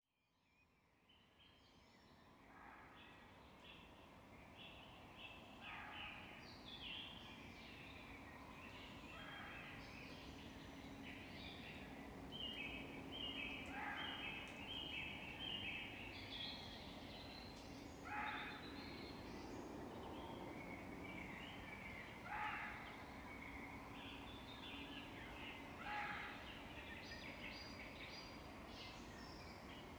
Soundscapes > Nature
Dawn Chorus June 2025
A small wooded area adjacent to a meadow and close to farmland. The recording starts with a distant muntjac calling before the birds become more dominant. Birds that can be heard include (hopefully, not too many errors or omissions!) - note that some occur only very briefly.: Common wood pigeon Song thrush Common blackbird Carrion crow European robin Tawny owl Blackcap Eurasian wren Chiffchaff Eurasian magpie Garden warbler Dunnock There is some road noise throughout but the worst of the aircraft noise has been cut out, which has shortened the recording. The time period represented here is approximately 03:45 to 05:20, with sunrise being at 04:40 at this location.
bird, birds, dawn-chorus, field-recording, nature